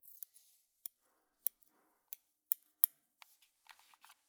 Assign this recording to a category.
Sound effects > Objects / House appliances